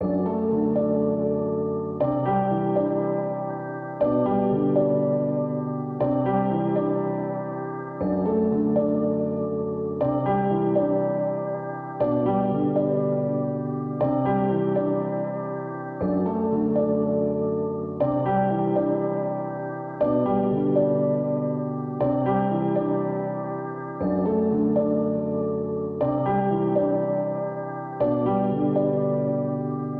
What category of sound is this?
Music > Solo instrument